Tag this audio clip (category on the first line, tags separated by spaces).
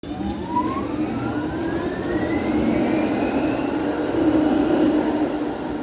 Soundscapes > Urban
rail tram tramway